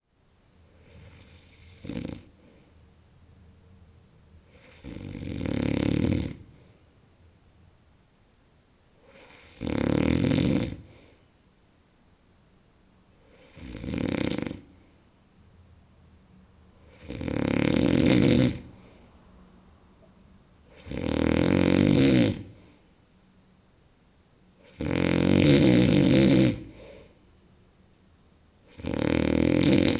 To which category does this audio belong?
Sound effects > Human sounds and actions